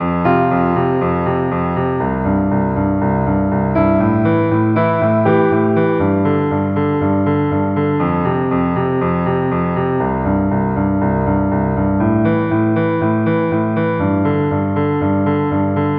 Music > Solo instrument
Ambient Piano Loop #002 Dark and Reflective at 120 bpm
Ambient piano loop at 120 bpm.
loop, piano, 120-bpm